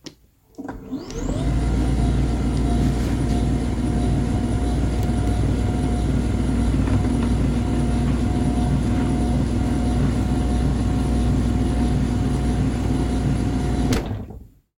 Sound effects > Other mechanisms, engines, machines
MOTRSrvo-Samsung Galaxy Smartphone, CU Servo Motor, Desk, Raise Nicholas Judy TDC
A desk servo motor raising.
desk, motor, Phone-recording, raise, servo